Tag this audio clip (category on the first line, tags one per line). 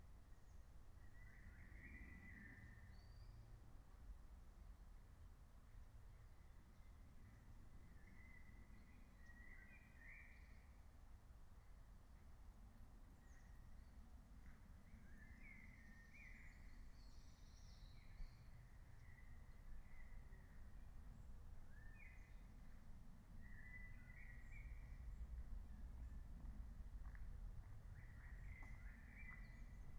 Soundscapes > Nature
phenological-recording
raspberry-pi
alice-holt-forest
nature
meadow
soundscape
field-recording
natural-soundscape